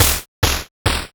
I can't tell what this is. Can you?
Instrument samples > Percussion
Synthed with phaseplant only. Processed with Khs Bitcrusher, Khs Phase Distortion, Khs Clipper, Khs 3-band EQ, Waveshaper.